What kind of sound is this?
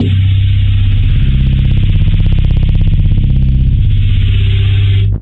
Instrument samples > Synths / Electronic
CVLT BASS 119
subs, bassdrop, wobble, clear, subbass, sub, subwoofer, lfo, drops, synthbass, synth, low, wavetable, bass, stabs, lowend